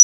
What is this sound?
Instrument samples > Percussion
5881 Hz short trigger
USE IT AT A VERY VERY LOW VOLUME!!! A 5881 ㎐ click used as a kick (attack) trigger in death metal. If you overdo it it sounds LIKE SHIT, AND I HATE IT!!! trigger triggers kicktrig kick-trigger kick-attack attack death-metal thrash metal thrash-metal corpsegrind grindcorpse kick